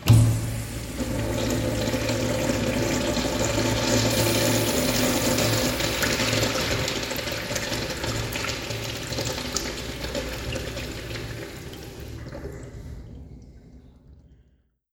Sound effects > Objects / House appliances
A urinal flushing.